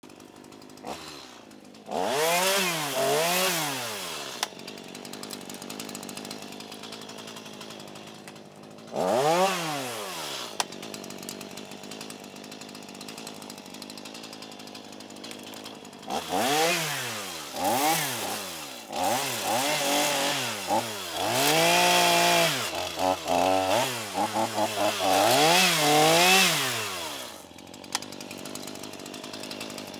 Sound effects > Other mechanisms, engines, machines
Small Chainsaw 1
A small chainsaw cutting down overgrown bushes. Rode NTG-3 (with Rycote fuzzy) into Sound Devices MixPre6. Recorded June 2nd, 2025, in Northern Illinois.
firewood, lumberjack